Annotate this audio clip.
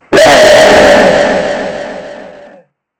Sound effects > Other
This is a edited version of 8-year-old-me screaming at the mic. Crediting is not obligatory. You can edit this sound. Please, don´t search where does this come from. It´s very cringe.
2020, critter, ghoul, scary, fnaf, credit-free, creature, jumpscare, ohno, scream, five-nights-at-freddy-s, horror, screaming, fear, cringe, weird, outdated, ghost, phanthom, monster, old